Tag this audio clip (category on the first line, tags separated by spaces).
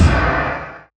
Instrument samples > Percussion
gong bronze cymbalgong metal metallic Dissolved-States-of-Hysteria basscrash crash gongcymbal percussion steel clang brass cymbal